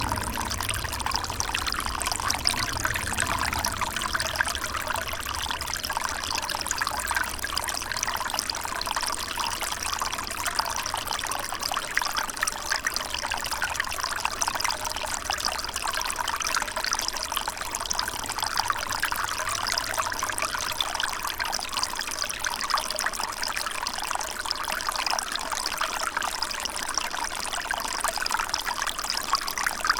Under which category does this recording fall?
Soundscapes > Nature